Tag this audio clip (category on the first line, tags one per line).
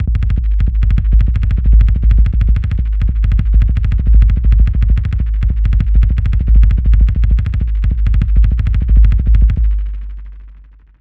Music > Other
199BPM
Kick
Kicks
Loud
Drum
199
Rave
Fast